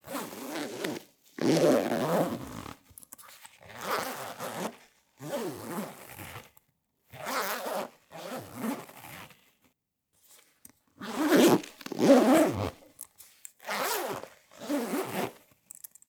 Sound effects > Objects / House appliances
A recording of a small rucksack zip being opened and closed. Fast and slow. Edited in RX11.